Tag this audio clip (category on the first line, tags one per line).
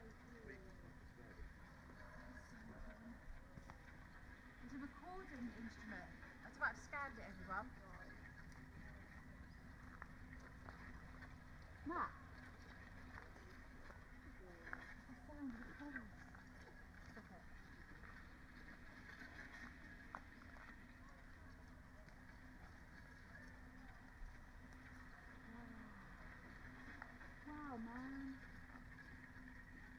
Soundscapes > Nature
sound-installation
artistic-intervention
raspberry-pi
phenological-recording
alice-holt-forest
natural-soundscape
weather-data
Dendrophone
field-recording
nature
data-to-sound
soundscape
modified-soundscape